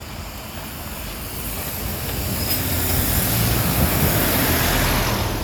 Soundscapes > Urban
Bus Slowing down: Rusty sound of gravel on the road, engine slowing down, street background sound. Recorded with Samsung galaxy A33 voice recorder. The sound is not processed. Recorded on clear afternoon winter in the Tampere, Finland.